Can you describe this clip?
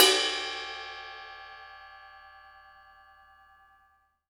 Music > Solo instrument
Cymbal Muted-004
FX, Crash, Drum, Drums, Oneshot, Paiste, Cymbals, Percussion, Ride, Sabian, Cymbal, Hat, Metal, Kit, GONG, Custom, Perc